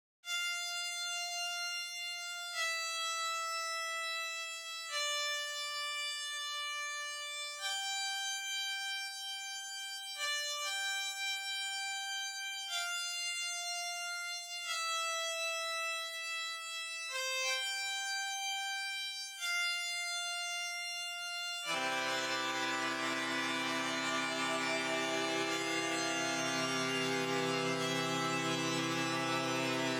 Music > Other
This is an expremely sad melody inspired by grief. Pefect for a crying scene or a cemetery scene in an independent movie. Or a very emotional art project that involves heart felt strong human emotions. This notes are on the c scale. Made in FL Studio, using the sytrus plugin.